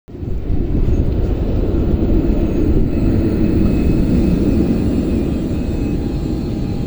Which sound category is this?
Sound effects > Vehicles